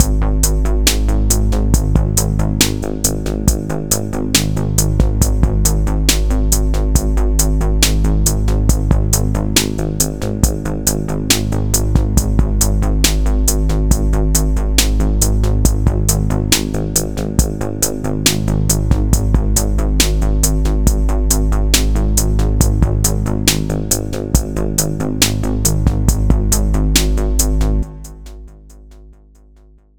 Music > Multiple instruments
69 Bpm Bass rythm 3y9pi
Bass, Beat, Downtempo, Fan, Helicopter, House, Loop, Psychill, Rhythm